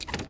Sound effects > Objects / House appliances
FOLYProp-Samsung Galaxy Smartphone, MCU Baby Seat Belt in Cart, Snap Open Nicholas Judy TDC
A baby seat belt snapping open in a cart. Recorded at Goodwill.